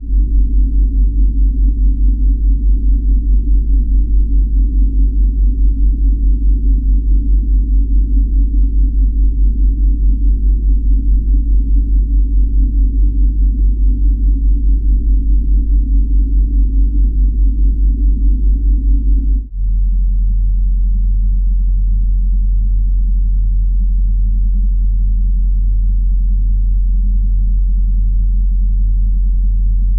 Sound effects > Objects / House appliances
GEOFONE Fridge Hum
domestic, fridge, geofone, hum, noise, refridgerator, sfx